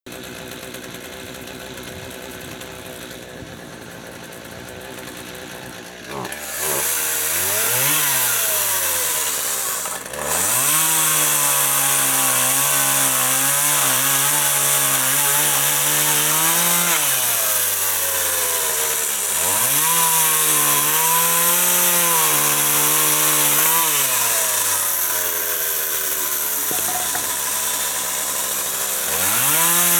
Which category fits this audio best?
Sound effects > Other mechanisms, engines, machines